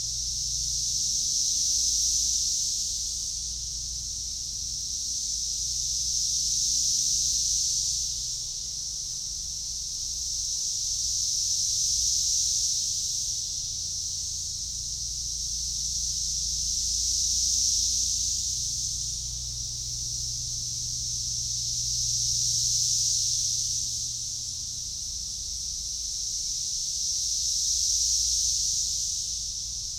Soundscapes > Nature
Cicadas: Small Creek
Brood XIV of 17 year cicadas emerged on 19 May 2025 in the Bluegrass region of central Kentucky, USA. It was a few days before they began singing. Over the course of about 2 weeks, the singing increased, and was at its peak in the afternoons. At first each insect was swelling at his own rate, but over the course of several days, the swelling became synchronized. This recording was made on 4 June 2025, beginning at 16:29 in a wooded area near a small creek. Temperature was about 30.5 degrees Celsius. Very little wind. In addition tot he cicadas, you may hear a distant lawn mower, an occasional flyby of a single engine prop plane, ands occasional birds- a woodpecker and a mourning dove. Microphone was a Joesphson C700S. MS output was encoded to LR stereo in post. Field recorder was a Sound Devices MixPre 10-2.
field-recording, insects, MS-recording, nature, summer